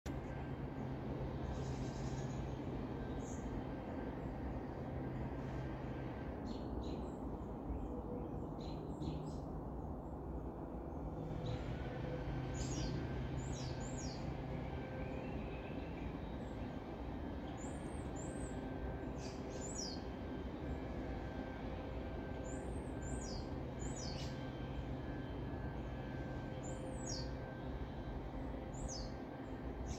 Soundscapes > Nature
AMBForst-Samsung Galaxy Smartphone, MCU Suburban, Birds, Distant Weedwhacker Nicholas Judy TDC
A suburban forest with birds and distant weedwhacker.
ambience, birds, field-recording, forest, Phone-recording, suburban, weedwhacker